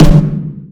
Instrument samples > Percussion
It's a bassy deepsnare. • snare 6x13 inches DW Edge (high frequencies lowered) • stepped fade out of 20 ㎐ + 30 ㎐ + 40 ㎐ (their mergefile had the high frequencies TOTALLY removed) WHEN YOU MIX BASS FREQUENCIES, HIGH FREQUENCIES ARE PRODUCED WHEN YOU MIX HIGH FREQUENCIES, BASS FREQUENCIES ARE PRODUCED see: physics, wave mechanics
timpano, drum, Mapex, bass-snare, Pearl, Yamaha, deepsnare, bassiest, Sonor, Noble-and-Cooley, drums, CC-Drum-Co, overbassed, British-Drum-Co, deep-snare, Pork-Pie, Spaun, bassized, bassy, Canopus, PDP, percussion, Ludwig, bassnare, Tama, Craviotto, beat, Gretsch, percussive, DW